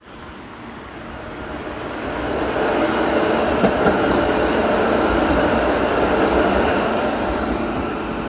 Soundscapes > Urban
The sound comes from a tram moving along steel rails, produced mainly by wheel–rail contact, the electric drive, and braking systems. It is characterized by a low-frequency rolling rumble, and rhythmic rail noise with occasional high-pitched braking squeals as the tram passes. The recording was made outdoors near a tram line in Hervanta, Tampere, using recorder in iPhone 12 Pro Max. The purpose of the recording is to provide a clear example of a large electric vehicle pass-by for basic audio processing and movement-related sound analysis.